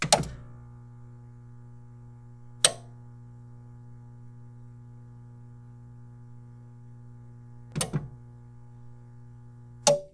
Sound effects > Objects / House appliances
Stereo Amp Powering On
The sound of a Sharp CD changer/stereo powering on, including relays and a subtle 60hz harmonic caused by the amplifier.
stereo, relay, AV, amplifier